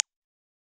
Sound effects > Other
Samsung A51 Phone speaker IR
Subject : A IR from deconvoluted sine sweep. A rode NT5 with omni capsule placed infront of a Samsung A51 phone speaker. Date YMD : 2025 December Location : Hardware : Tascam FR-AV2 Weather : Processing : Trimmed and normalised in Audacity. Notes : Tips : This is intended to be used with a convolution plugin.
Timbre, NT5-o, NT5o, Phone, Tone-IR, IR, speaker, Speaker-simulation, Deconvoluted-Sinesweep, Tone, Amp-sim, Impulse-Response